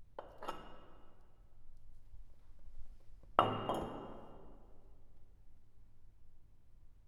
Sound effects > Objects / House appliances
A glass bottle being set down on a marble floor (in an apartment building stairwell). Recorded with a Zoom H1.